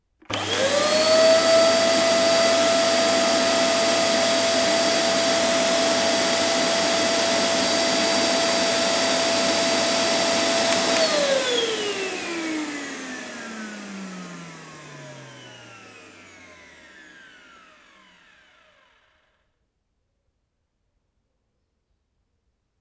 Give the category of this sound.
Sound effects > Objects / House appliances